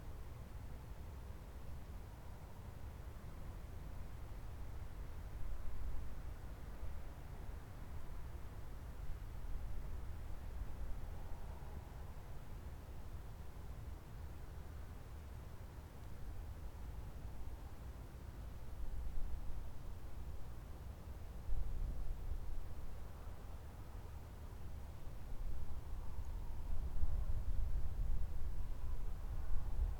Soundscapes > Nature
250418 23h07 Gergueil field ORTF
Subject : From a field in Gergueil, pointing towards Poisot. At night recording though I was in the recording direction grabbing a mic, I did trim start/end so I should be far away Date YMD : 2025 04 18 23h07 Location : Right next to the football field Gergueil France. Hardware : Tascam FR-AV2, Rode NT5 in a ORTF configuration with WS8 windshield Weather : Night time, little to no wind. Processing : Trimmed and Normalized in Audacity.
Friday, Nature, spring